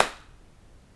Sound effects > Other
Albi bedroom impulse and response 1 (Window side clap) 4m90 X 3m X 2m70
Subject : Trying to record the ugly reverb of my room. Room dimensions 4m90 X 3m X 2m70 Date YMD : 2025 June Location : Albi 81000 Tarn Occitanie France indoor. Hardware : Tascam FR-AV2 and a Superlux ECM-999 Weather : Processing : Trimmed in Audacity. Notes : Never did / used a impulse response so hope this is good enough. Tips : Saying "impulse AND response" not just the response. You might want to trim off the impulse.
bedroom, clap, ECM-999, ECM999, finger-snap, FR-AV2, home, Impulse, impulse-response, reponse, Superlux, Tascam, ugly, unpleasent